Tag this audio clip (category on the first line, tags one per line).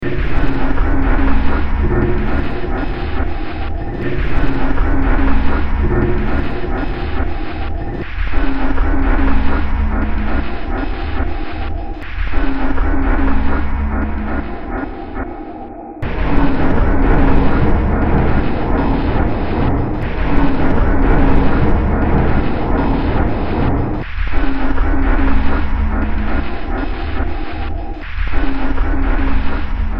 Music > Multiple instruments
Ambient
Games
Horror
Industrial
Noise